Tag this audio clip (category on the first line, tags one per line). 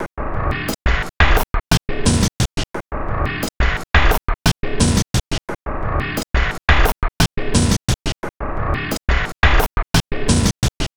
Instrument samples > Percussion
Ambient
Loop
Soundtrack
Industrial
Drum
Samples
Underground